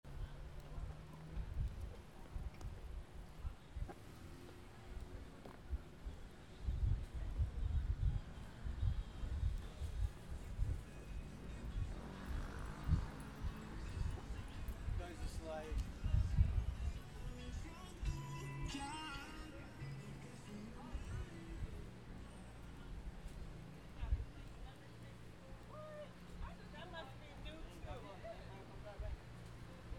Soundscapes > Urban
walking on the street